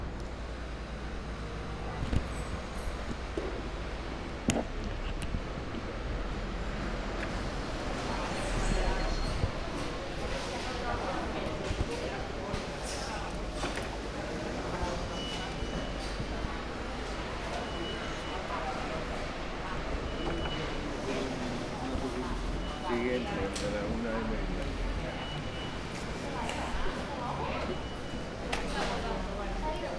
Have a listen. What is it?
Sound effects > Human sounds and actions
20250326 MercatSantAndreu Humans Commerce Quiet Nice
Urban Ambience Recording in collab with Martí i Pous High School, Barcelona, March 2025, in the context of a sound safari to obtain sound objects for a sound narrative workshop. Using a Zoom H-1 Recorder.
Nice, Humans, Commerce, Quiet